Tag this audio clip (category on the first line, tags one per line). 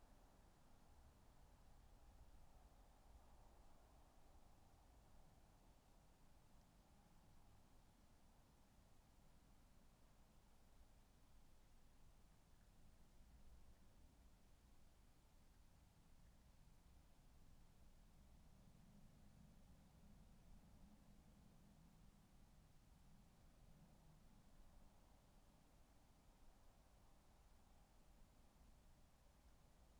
Soundscapes > Nature
natural-soundscape
raspberry-pi
field-recording
data-to-sound
sound-installation
Dendrophone
artistic-intervention
modified-soundscape
nature
soundscape
weather-data
phenological-recording
alice-holt-forest